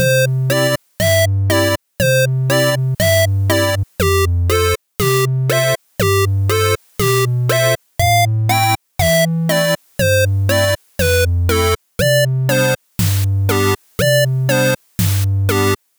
Music > Multiple instruments
Treasure Island Beach Tavarn Jingle
A lively 8-bit tavern background loop inspired by classic adventure games. Built from scratch in FL Studio using only native instruments like 3xOsc for triangle bass, pulse chords, square leads, and noise-based percussion. Mixed with EQ shaping and subtle automation to create a playful pirate tavern feel, with background noise designed to resemble ocean waves at the shoreline. Seamless loop for game projects, retro-style videos, or creative storytelling. Add the credit in your video description, game credits, or project page.